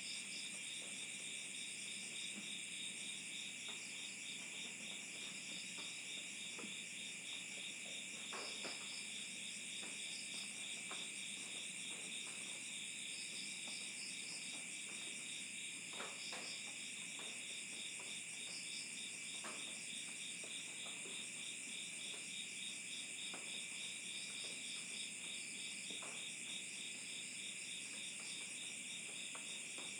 Soundscapes > Nature
250728 014430 PH Insects chirping in the night
Insects (mostly crickets) chirping in the night. Pay attention to the change in the frequency of the chirp at #1:18 and #1:30. Quite amazing... Recorded from the window of a house located in the surroundings of Santa Rosa (Baco, Oriental Mindoro, Philippines)during July 2025, with a Zoom H5studio (built-in XY microphones). Fade in/out and high pass filter at 180Hz -48dB/oct applied in Audacity.
ambience, atmosphere, calm, chirp, chirping, chirrup, countryside, crickets, drops, field-recording, insects, nature, night, Philippines, rain, relaxing, Santa-Rosa, soundscape